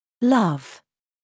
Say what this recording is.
Speech > Solo speech
to love
voice, english, word, pronunciation